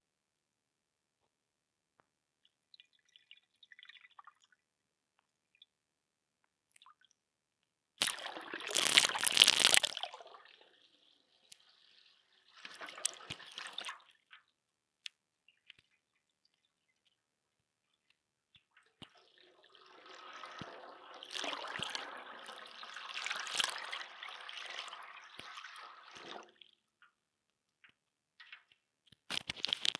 Sound effects > Natural elements and explosions
hydrophone test
Hello. Just recording i got from testing my diy hydrophone. Funny enough the fact that i crafted contact mic at first then i realized, that i can turn in into hydrophone using some household stuff. Recorded using Tascam dr-05x.
barrel, fluid, hydrophone, liquid, splash, stream, test, water